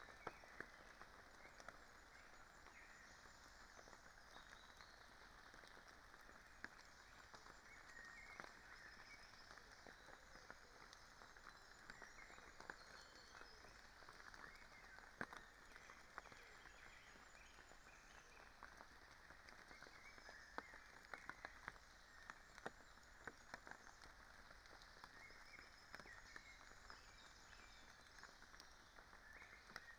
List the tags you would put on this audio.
Soundscapes > Nature
soundscape
artistic-intervention
phenological-recording
weather-data
alice-holt-forest
Dendrophone
natural-soundscape

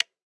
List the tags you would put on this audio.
Sound effects > Human sounds and actions
off
switch
button
interface
toggle

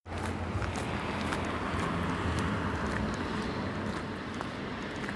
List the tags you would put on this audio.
Soundscapes > Urban
car
vehicle

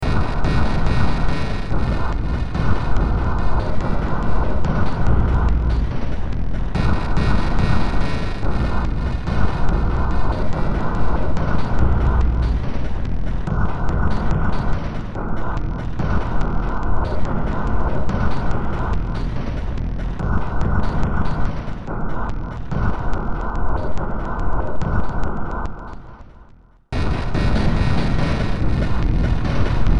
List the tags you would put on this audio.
Music > Multiple instruments
Soundtrack
Sci-fi
Cyberpunk
Games
Industrial
Ambient
Horror
Underground
Noise

